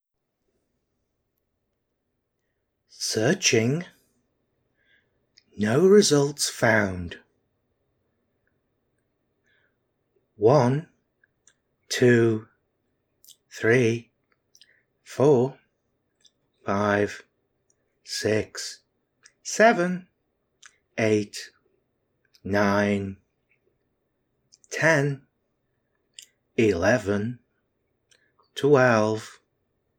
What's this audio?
Speech > Solo speech
I asked a robot "what are the most common phrases you use in your day to day life?" and have repeated them here verbatim for you to use in your projects! A second time! The bulk of the file is an unprocessed recording of a voice session. It ends with a processed example of what you might do with the voice recording, using audio processing. Transcript: Searching. No results found. (Count from 1-20, then in tens to 100. Thousand. Million. Billion. Trillion. And.] Results found. I am programmed to speak to you in a lovely British accent to keep you calm. There is currently an emergency situation unfolding. The engines are failing, and you are all going to die in a blazing fireball. Please remain calm. Engine failure. Loading. Error. Circuit failure. Junction. Tube. The relevant local authorities have been alerted. At the tone, the time will be... Half. Quarter. Past. To. Drive plate. Anomaly. Alien life form detected. Quarantine protocols engaged. Bacterial infection detected.